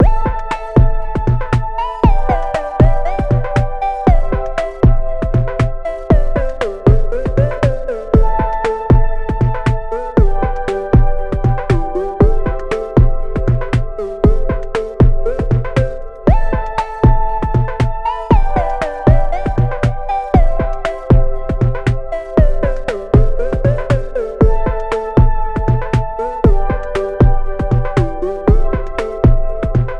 Music > Multiple instruments
Happy Chill Electro Diddly 118bpm
A chill loop I created with FL Studio and various vst synths